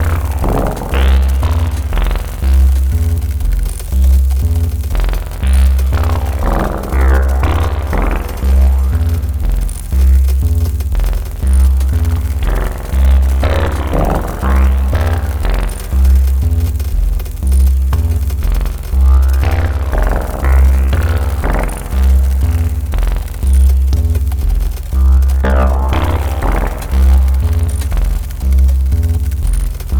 Music > Other
Atonal Ambient Texture with Rain and Synthetic Vocal
Experimental loop done with Torso S4 It's loopable at 120bpm Rain is done by some clicks Rhythm is by granular synthesis of a low frequency sinusoidal tone properly saturated
atonal, drum, rhythm, texture